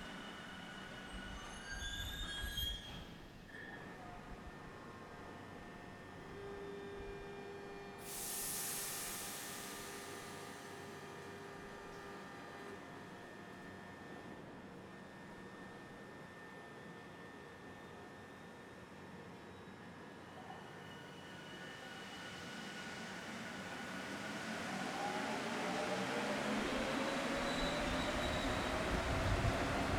Soundscapes > Urban
CDMX metro
a metro tren arrives and leave in Mexico city station
field-recording, metro, mexico